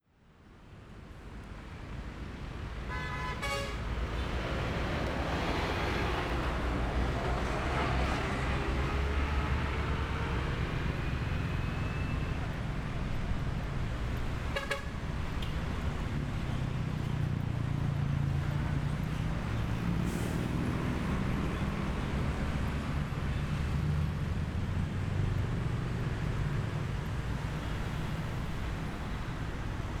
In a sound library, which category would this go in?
Soundscapes > Urban